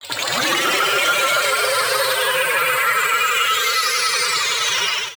Sound effects > Electronic / Design
Optical Theremin 6 Osc Destroyed-012
Alien, Digital, Electro, Electronic, Infiltrator, Optical, Otherworldly, Sci-fi, SFX, Spacey, Sweep, Synth, Theremin